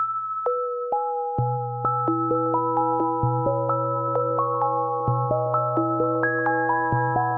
Music > Solo instrument
Dreamy plucks 140bpm
Made in FL Studio with Toxic Biohazard. Leave a rating if you like it Use for anything :)
piano, plucks, 140bpm, pulsing